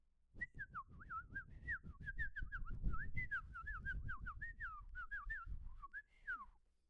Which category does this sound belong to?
Sound effects > Human sounds and actions